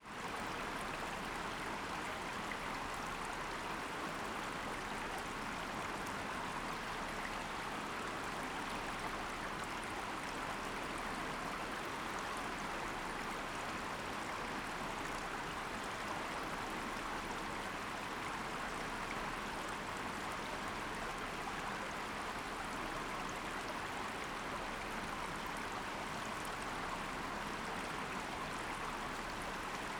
Soundscapes > Indoors
Empty swimming pool. Ambient. Water
pool, swimming, Water, Ambient